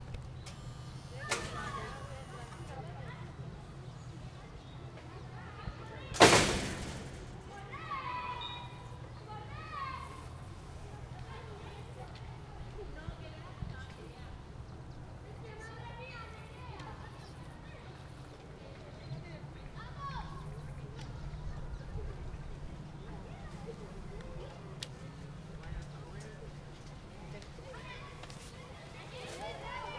Soundscapes > Urban
20251024 EscolaPauVila Humans Voices Energetic
Urban Ambience Recording in collab with EMAV Audiovisual School, Barcelona, November 2026. Using a Zoom H-1 Recorder.
Energetic, Humans, Voices